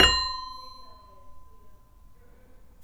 Sound effects > Other mechanisms, engines, machines
metal shop foley -056
bam, bang, boom, bop, crackle, foley, fx, knock, little, metal, oneshot, perc, percussion, pop, rustle, sfx, shop, sound, strike, thud, tink, tools, wood